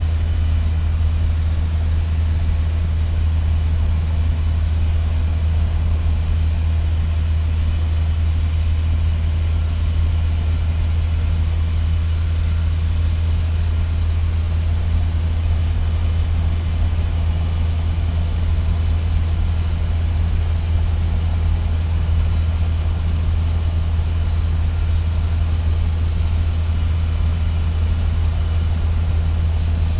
Soundscapes > Urban
Driving In A Vintage Taxi - Cuba on the way to Vinales
Taxi, Cuba, Vinales, A, way, Driving, Vintage, In